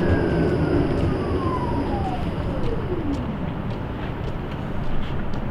Sound effects > Vehicles
Tram00044820TramArriving
Tram arriving to a nearby stop. Recorded during the winter in an urban environment. Recorded at Tampere, Hervanta. The recording was done using the Rode VideoMic.
tram, transportation, winter, field-recording